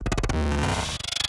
Sound effects > Electronic / Design
GLITCH OVER
A twisted electronic bit for post-production. Make sure to wear gloves when handling this one!
circuit-bent, circuits, design, effect, effects, electric, eleectronic, glitch, noise, sfx, sound, sound-design, sound-effect